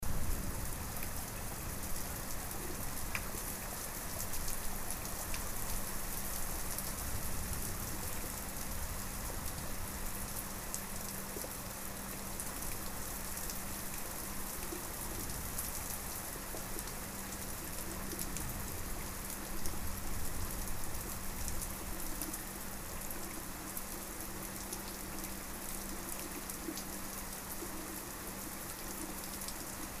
Soundscapes > Nature
Lime Grove thunderstorm #1
The beginnings of a largish thunderstorm; 30 seconds of rain followed by a modest thundercrack followed by a bass echo, another 70 seconds of rain followed by a similar thundercrack and echo. No recording overload. Recorded on an Edirol field recorder in Thornton-Cleveleys (UK) on 1st July 2009.
ambience, bass-rumble, build-up, clean, downpour, Field-recording, high-quality, Lightning, loopable, modest, Nature, Rain, reverberation, sequence, soundscape, Storm, Thunder, thunder-crack, Thunder-storm, Thunderstorm, uk-weather, urban, Weather